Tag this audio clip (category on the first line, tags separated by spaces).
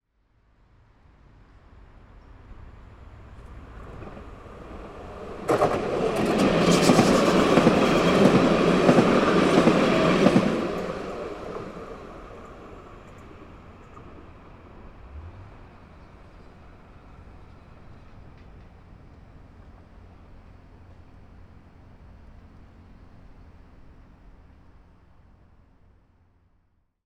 Sound effects > Vehicles
ambience atmosphere city field-recording France passing public-transportation rail railway Sevres soundscape suburban town traffic train tram tramway urban wheels